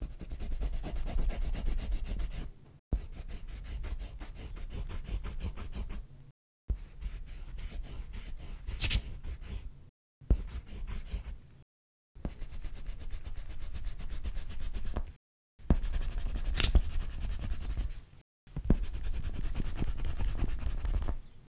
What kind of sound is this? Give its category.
Sound effects > Objects / House appliances